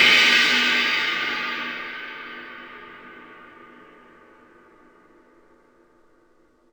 Instrument samples > Percussion
crash no attack - very long
• digitally low-pitched crash: 16" (inches) Sabian HHX Evolution Crash • microphone: Shure SM81
Avedis, bang, China, clang, clash, crack, crash, crunch, cymbal, Istanbul, low-pitched, Meinl, metal, metallic, multi-China, multicrash, Paiste, polycrash, Sabian, shimmer, sinocrash, sinocymbal, smash, Soultone, spock, Stagg, Zildjian, Zultan